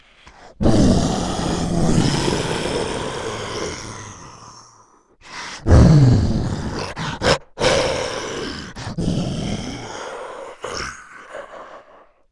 Sound effects > Other
A deep, aggressive beast roar / demon growl sound effect — perfect for horror, fantasy, or creature design. This is my original voice recording, captured using a Rode NT1 microphone and Focusrite audio interface. Edited and enhanced in Adobe Audition with pitch shifting and dynamic processing for a terrifying, cinematic effect. Use it for: Demons or monsters in games Horror films or trailers Fantasy creatures or boss battles Audio drama or sound design Recording Chain: Voice: Performed by me Mic: Rode NT1 Interface: Focusrite Scarlett DAW: Adobe Audition FX: Pitch shift, EQ, light reverb